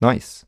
Speech > Solo speech
Joyful - Nice 2
dialogue, FR-AV2, happy, Human, joy, joyful, Male, Man, Mid-20s, Neumann, Nice, NPC, oneshot, singletake, Single-take, talk, Tascam, U67, Video-game, Vocal, voice, Voice-acting, word